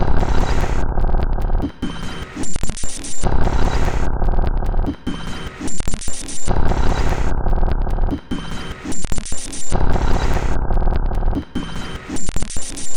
Instrument samples > Percussion
This 148bpm Drum Loop is good for composing Industrial/Electronic/Ambient songs or using as soundtrack to a sci-fi/suspense/horror indie game or short film.
Industrial, Samples, Drum, Dark, Loopable, Soundtrack, Packs, Alien, Weird, Underground, Ambient, Loop